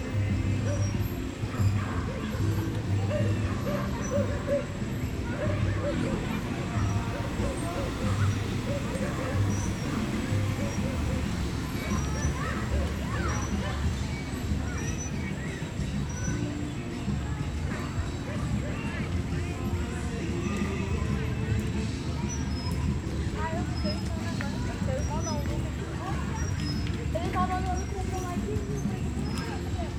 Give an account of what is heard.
Soundscapes > Urban
AMBTown-Zh6 Praça do Dinossauro, Novo Airão, 7pm, people passby, music, children playground, motorcycle, car pass by, dog, bark, pagode, bar, pub FILI URPRU
Ambiência. Cidade. Pessoas passando, música, crianças no parquinho, motos e carros passando, latidos de cachorro, pagode, bar, pub. Gravado na Praça do Dinossauro, Novo Airão, Amazonas, Amazônia, Brasil. Gravação parte da Sonoteca Uirapuru. Em stereo, gravado com Zoom H6. // Sonoteca Uirapuru Ao utilizar o arquivo, fazer referência à Sonoteca Uirapuru Autora: Beatriz Filizola Ano: 2025 Apoio: UFF, CNPq. -- Ambience,. Town. People pass by, music, children playground, motorcycle, car pass by, dog, bark, pagode, bar, pub. Recorded at Praça do Dinossauro, Novo Airão, Amazonas, Amazônia, Brazil. This recording is part of Sonoteca Uirapuru. Stereo, recorded with the Zoom H6. // Sonoteca Uirapuru When using this file, make sure to reference Sonoteca Uirapuru Author: Beatriz Filizola Year: 2025 This project is supported by UFF and CNPq.
pass-by, motorcycles, people, bar, children, playground, portuguese, bark, noise, dog, field-recording, cars